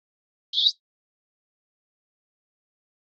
Sound effects > Animals
Bird Tweet 3
Bird sound made with my voice.
bird, chirp, tweet